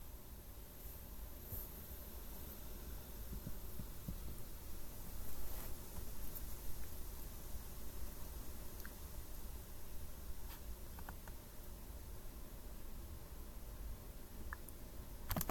Soundscapes > Nature
Wistful Winds
I just recorded this sound on my computer, nothing big.
Calming, Nice